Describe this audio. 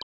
Instrument samples > Percussion
Organic-Water Snap 8.1
EDM, Botanical, Organic, Snap